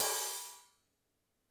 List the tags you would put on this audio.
Music > Solo percussion
crash cymbals drums

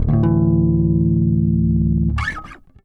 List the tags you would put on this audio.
Music > Solo instrument
slide harmonics riff note slap fuzz bassline riffs chuny low chords electricbass funk pluck blues lowend pick harmonic bass rock slides basslines notes electric

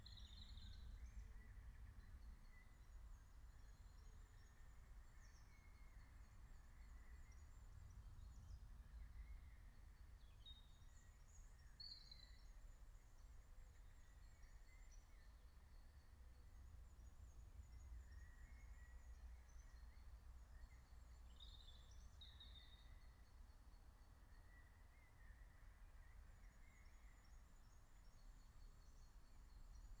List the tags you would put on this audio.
Soundscapes > Nature
alice-holt-forest natural-soundscape nature soundscape meadow raspberry-pi phenological-recording field-recording

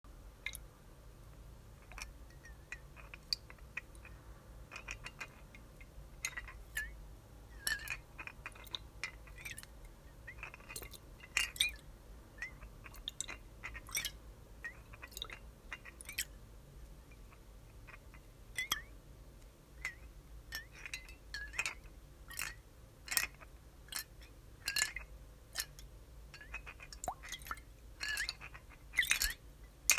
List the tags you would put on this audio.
Sound effects > Objects / House appliances
water drip liquid

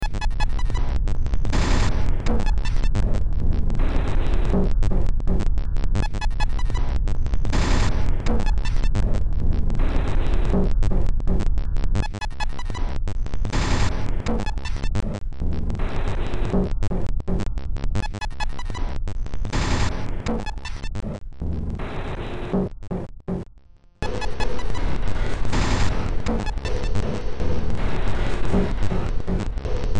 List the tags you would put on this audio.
Music > Multiple instruments
Noise Cyberpunk Horror Ambient Industrial Soundtrack Sci-fi Games Underground

